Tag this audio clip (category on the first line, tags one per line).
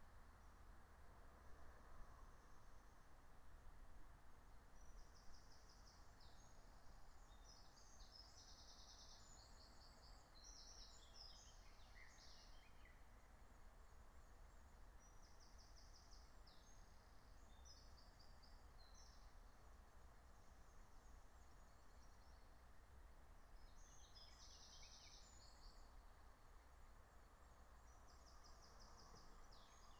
Soundscapes > Nature
nature
soundscape
natural-soundscape
phenological-recording
field-recording
alice-holt-forest
raspberry-pi
meadow